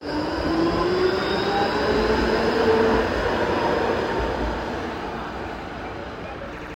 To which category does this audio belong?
Sound effects > Vehicles